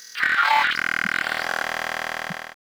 Sound effects > Electronic / Design
Quick Droid Sequence
Abstract, Alien, Analog, Automata, Creature, Digital, Drone, Experimental, FX, Glitch, Neurosis, Noise, Otherworldly, Robotic, Spacey, Synthesis, Trippin